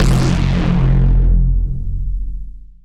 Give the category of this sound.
Instrument samples > Synths / Electronic